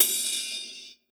Instrument samples > Percussion
Hyperrealism V9 Ride
cymbals, digital, drum, drums, machine, one-shot, sample, stereo